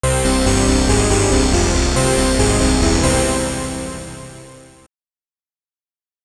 Instrument samples > Synths / Electronic
Deep Pads and Ambient Tones11
Deep, Analog, Tones, Tone, Chill, bassy, Pad, Synth, Dark, Synthesizer, synthetic, Haunting, Digital, Ambient, Note, Pads, bass, Oneshot, Ominous